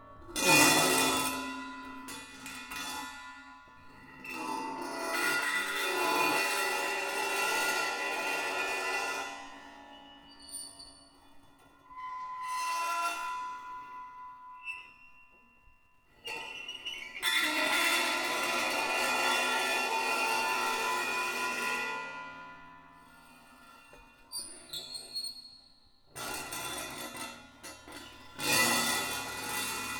Music > Solo percussion
drum Scratch STE-002
drum skrech in the studio recorded in zoom h4n